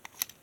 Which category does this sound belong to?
Sound effects > Other